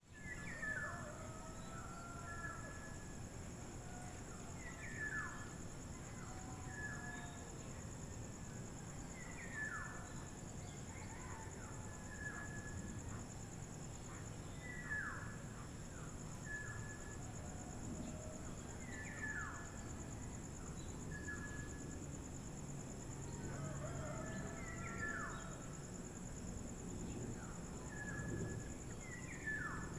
Soundscapes > Urban
Dawn chorus and sunrise in a Filipino suburb. I made this recording at about 5:15AM, from the terrace of a house located at Santa Monica Heights, which is a costal residential area near Calapan city (oriental Mindoro, Philippines). One can hear the atmosphere of this place during sunrise, with some crickets, dawn chorus from local birds that I don’t know, roosters and dogs barking in the distance, as well as distant traffic hum, and few vehicles passing by in the surroundings. At #4:02, the cicadas start to make some noise, and at #14:17, the bell from the nearby church starts ringing. Recorded in July 2025 with a Zoom H5studio (built-in XY microphones). Fade in/out applied in Audacity.